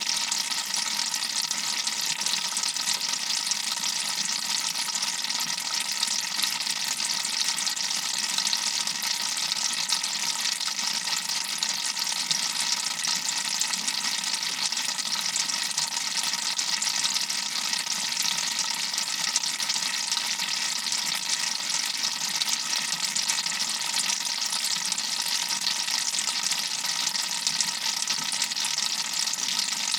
Sound effects > Animals
Freshly caught Crayfish in a cooler, and their crackling words. Mics were placed deeper in the cooler than take #1, with lid on, resulting in a more boxy sound. Des écrevisses fraîchement pêchées dans une glacière, et leur langage de cliquetis et crépitements. Microphones rapprochés, placés à l'intérieur de la glacière, avec le couvercle.